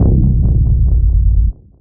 Instrument samples > Synths / Electronic
CVLT BASS 50
bass, bassdrop, lfo, low, subbass, subs, subwoofer